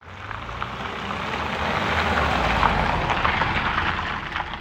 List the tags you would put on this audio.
Sound effects > Vehicles
car combustionengine driving

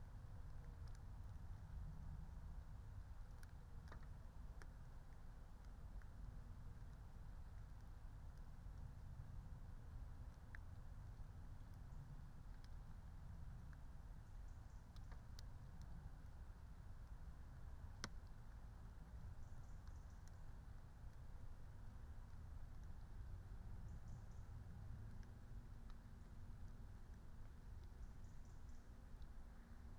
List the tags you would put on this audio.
Soundscapes > Nature
soundscape nature natural-soundscape raspberry-pi alice-holt-forest meadow field-recording phenological-recording

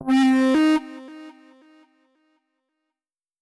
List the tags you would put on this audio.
Instrument samples > Synths / Electronic
SERUM2 AUDACITY

